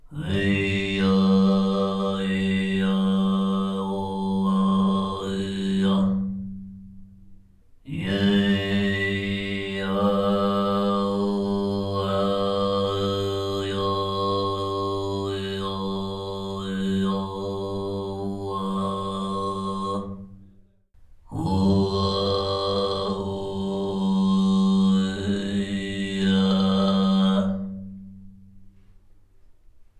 Speech > Other
Throat Singing in a Cave
Throat singing I recorded in a cave for a university short film project. More specifically, it was recorded in the filming location for that one underground room on Lankiveil in Dune: Prophecy.